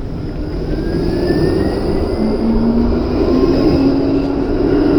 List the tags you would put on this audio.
Sound effects > Vehicles
tramway transportation vehicle

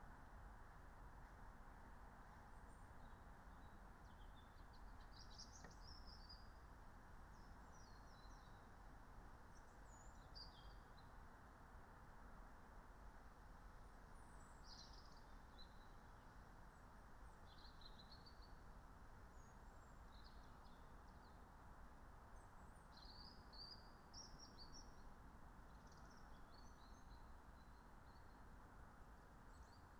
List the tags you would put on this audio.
Soundscapes > Nature
soundscape meadow alice-holt-forest nature phenological-recording raspberry-pi field-recording natural-soundscape

